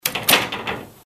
Sound effects > Objects / House appliances
Closed door at the house.
house, slamming, closing, close, door, wooden